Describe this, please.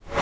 Sound effects > Other
Reverse comming into place SFX
Subject : Sound of things sliding into place. Probably useful with something in reverse. Date YMD : 2025 04 Location : Gergueil France. Hardware : Tascam FR-AV2, Rode NT5 Weather : Processing : Trimmed and Normalized in Audacity.
2025, FR-AV2, NT5, Rode, SFX, Tasam